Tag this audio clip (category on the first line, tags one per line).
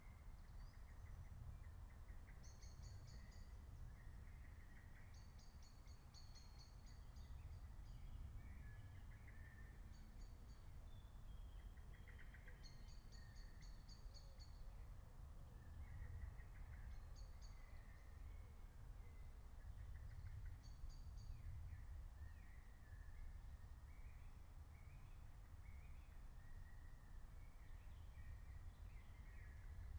Soundscapes > Nature
field-recording
meadow
nature
raspberry-pi
soundscape